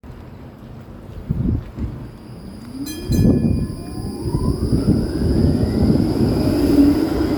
Sound effects > Vehicles
03.Tram toleave hervanta28.11
A tram is leaving the stop, it is speeding up, and ringing a bell to warn people walking about its departure. Recorded by a Samsung phone in Hervanta, Finland.